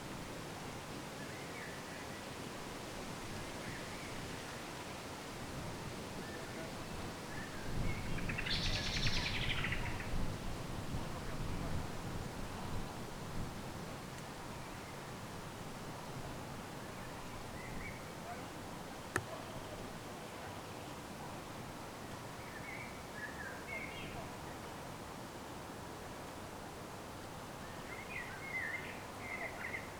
Soundscapes > Nature
Olsztyn Góry Towarne Jura Krakowsko-Częstochowska 2025-06-21 15:36 Field Recording
Mostly wind, bird and human noises. I wanted to record birds in the forest, but wind was too strong, blowed into my recorder, so there are some unwanted noises. At the begining wind blows and human talks in the background, but at the end there is more birds and flies. So first half of recording is mix of various sounds, but second half of track is better in my opinion. For the context I saved whole recording.